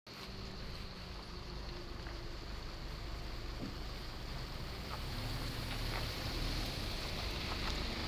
Sound effects > Vehicles
tampere bus7
bus, transportation, vehicle